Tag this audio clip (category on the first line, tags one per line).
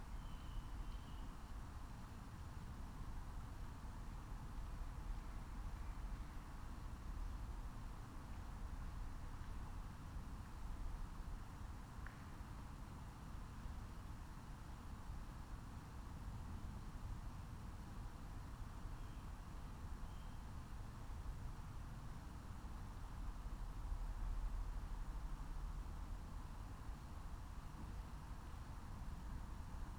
Soundscapes > Nature
natural-soundscape
nature
phenological-recording
meadow
raspberry-pi
field-recording
alice-holt-forest
soundscape